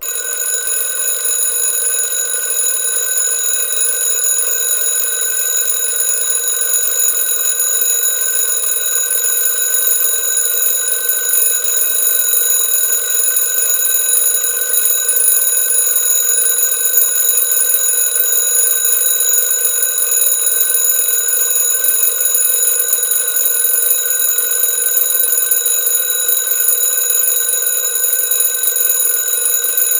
Sound effects > Objects / House appliances
ALRMClok-Blue Snowball Microphone, MCU Alarm Clock, Ringing, Classic Nicholas Judy TDC
A classic alarm clock ringing.
alarm, clock, ringing, Blue-brand, Blue-Snowball, classic